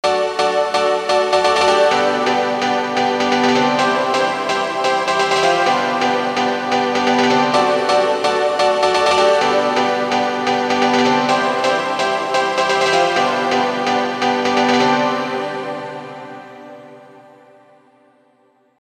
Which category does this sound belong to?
Music > Solo instrument